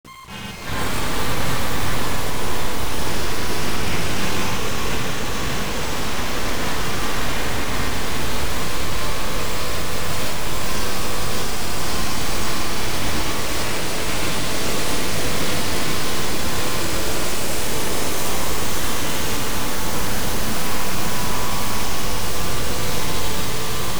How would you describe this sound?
Sound effects > Electronic / Design
Ghost Grain Scratch 1
abstract, ambient, commons, creative, noise, noise-ambient